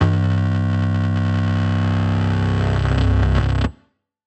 Sound effects > Electronic / Design
crunchy feedback buzz with tail wipe
Nice feedback tone with a cool crackling sound at the end
feedback, noise, crackle, hum, buzz, drone